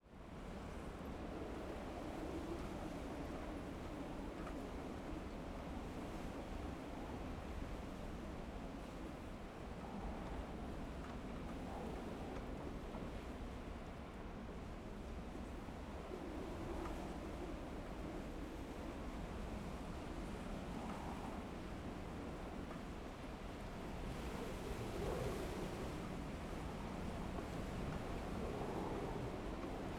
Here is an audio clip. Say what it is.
Soundscapes > Nature
Recording of 10 Beaufort winds, storm conditions with very high waves (29-41 feet), dense foam streaks, and reduced visibility at sea. 10 Beaufort winds are equivalent to 55-63 mph or 89-102 km/h. This is a field recording, on the island of Tinos, Greece. Recorder used: ZOOM H2essential in a special foam-protected housing to guard the equipment from the wind and the sea spray.
wind, gale-force, 10, ambience, field-recording, Beaufort